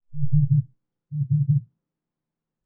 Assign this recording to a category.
Sound effects > Objects / House appliances